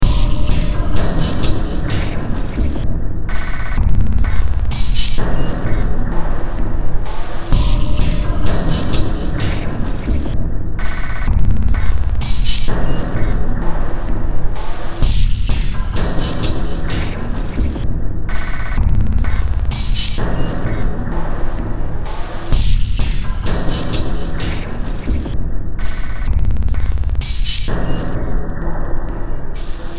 Music > Multiple instruments
Demo Track #3095 (Industraumatic)
Industrial, Games, Cyberpunk, Horror, Soundtrack, Sci-fi